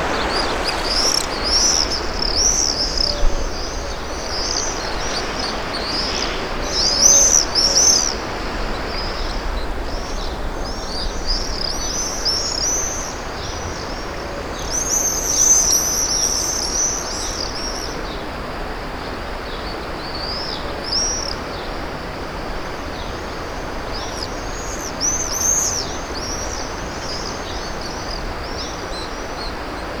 Sound effects > Animals
250710 20h16 Esperaza - Focusing on birds MKE600
Subject : Recording birds from Av. René Cassin in Esperaza. Sennheiser MKE600 with stock windcover P48, no filter. Weather : Processing : Trimmed in Audacity.